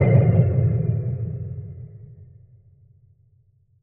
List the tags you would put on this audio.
Sound effects > Electronic / Design
BASSY BOOM DEEP DIFFERENT EXPERIMENTAL EXPLOSION HIPHOP HIT IMPACT INNOVATIVE LOW RAP RATTLING RUMBLING TRAP UNIQUE

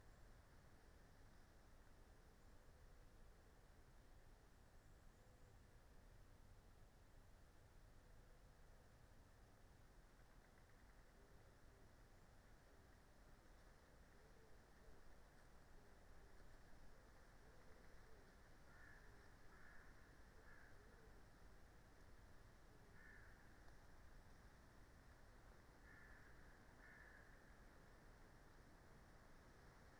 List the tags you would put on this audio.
Soundscapes > Nature
weather-data soundscape field-recording data-to-sound natural-soundscape sound-installation modified-soundscape phenological-recording alice-holt-forest artistic-intervention Dendrophone raspberry-pi nature

